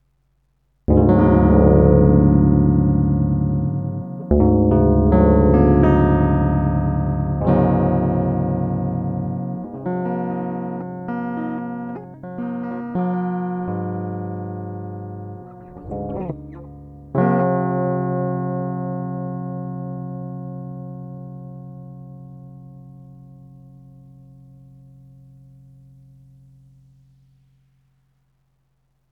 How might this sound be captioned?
Instrument samples > String
Electric guitar
just a few strums of electric strings
ambient, chord, guitar